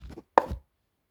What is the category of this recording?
Sound effects > Objects / House appliances